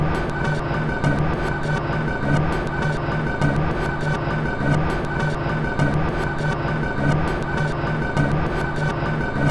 Instrument samples > Percussion
This 202bpm Drum Loop is good for composing Industrial/Electronic/Ambient songs or using as soundtrack to a sci-fi/suspense/horror indie game or short film.
Samples, Industrial, Ambient, Drum, Loop, Alien, Soundtrack, Weird, Underground, Dark, Packs, Loopable